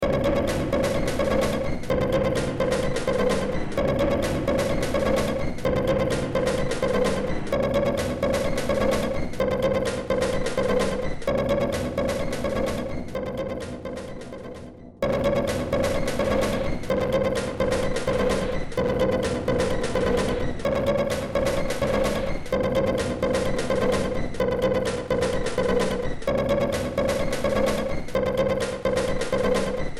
Music > Multiple instruments
Demo Track #3942 (Industraumatic)
Ambient, Horror, Industrial, Noise, Underground